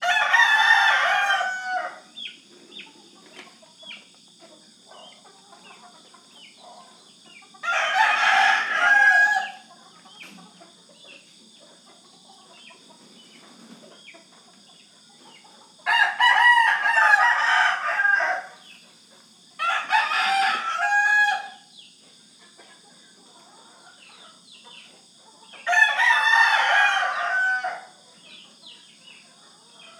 Sound effects > Animals
250727 052111 PH Roosters chicken chicks and dogs in the morning
Roosters, chicken, chicks, crickets and dogs in the morning. Recorded from the window of a house located in the surroundings of Santa Rosa (Baco, Oriental Mindoro, Philippines)during July 2025, with a Zoom H5studio (built-in XY microphones). Fade in/out and high pass filter at 180Hz -48dB/oct applied in Audacity.
ambience, atmosphere, barking, birds, chick, chicken, chickens, chicks, cock-a-doodle-doo, countryside, crickets, dog, dogs, farm, field-recording, insects, morning, outdoor, Philippines, rooster, roosters, Santa-Rosa, soundscape